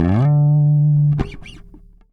Music > Solo instrument
slide up to note higher vibrato 2
bass, bassline, basslines, chords, chuny, electric, electricbass, funk, fuzz, harmonic, harmonics, low, lowend, note, notes, pick, riff, riffs, rock, slap